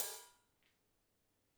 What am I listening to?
Music > Solo percussion
3 half long
crash, cymbals